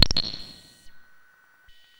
Instrument samples > Synths / Electronic
Benjolon 1 shot4
1SHOT, NOSIE, DRUM, SYNTH, MODULAR, CHIRP, BENJOLIN